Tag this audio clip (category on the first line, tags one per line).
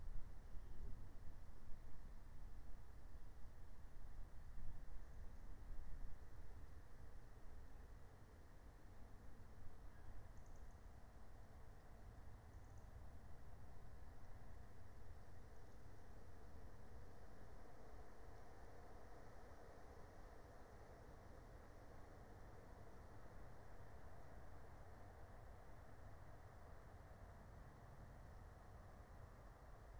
Soundscapes > Nature
nature
soundscape
phenological-recording
field-recording
meadow